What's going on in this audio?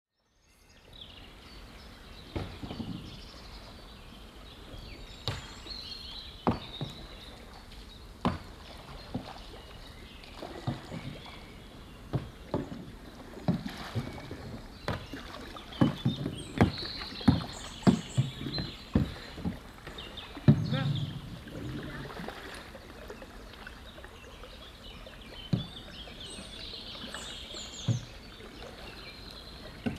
Soundscapes > Nature
Canoe along the river
Canoe in a calm river
water, river, kayak, field-recording, nature, canoe